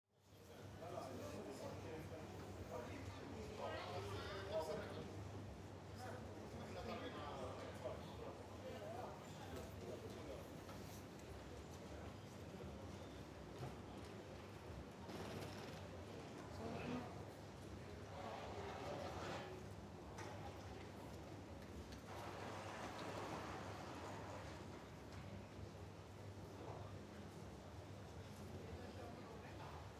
Soundscapes > Urban
AMBTran Trainstation GILLE VIENNA ZoomH3VR 2026-01-12 BFormat 5.6 16
3d, ambisonics, announcement, people, public, trainstation